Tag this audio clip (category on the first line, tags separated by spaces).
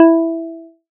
Instrument samples > Synths / Electronic
additive-synthesis fm-synthesis pluck